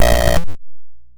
Sound effects > Electronic / Design
Optical Theremin 6 Osc dry-038
Bass, Experimental, Theremins, Noise, Sweep, Robot, Optical, Robotic, FX, DIY, Handmadeelectronic, Synth, Scifi, Glitch, Infiltrator, Digital, Theremin, Dub, Electronic, Otherworldly, Electro, Trippy, Analog, Glitchy, SFX, Spacey, noisey, Alien, Sci-fi, Instrument